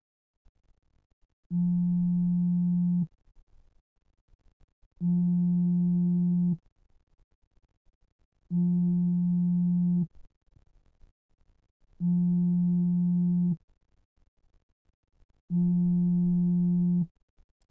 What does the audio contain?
Sound effects > Electronic / Design
Phone call vibration
The vibrations of a samsung Galaxy A55 during an incomming call.
mobile, call, phone